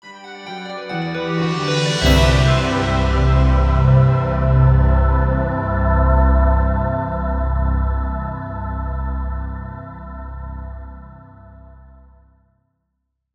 Music > Multiple instruments
Felt like this one was mixed a bit better.